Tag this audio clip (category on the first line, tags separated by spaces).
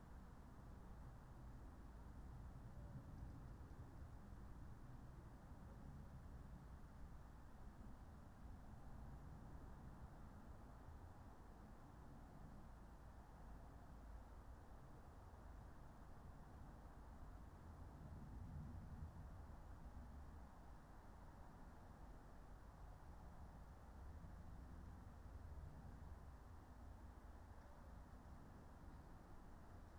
Soundscapes > Nature
soundscape natural-soundscape sound-installation artistic-intervention nature phenological-recording modified-soundscape alice-holt-forest raspberry-pi weather-data field-recording Dendrophone data-to-sound